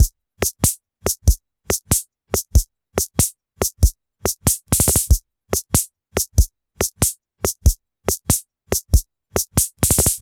Instrument samples > Percussion
94 Welson Loop 01
Loops and one-shots made using Welson Super-Matic Drum Machine
94bpm, DrumLoop, DrumMachine, Drums, Electro, Electronic, Hi-Hats, Loop, Rare, Synth, Vintage